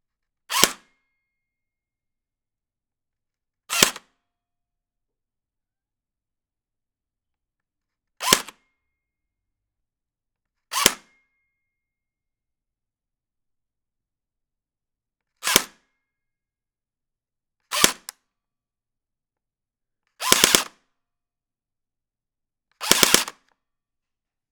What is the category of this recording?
Sound effects > Objects / House appliances